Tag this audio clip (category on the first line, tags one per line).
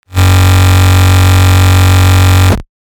Instrument samples > Synths / Electronic
Frenchcore
Hardcore
Bass
Hardstyle
Hard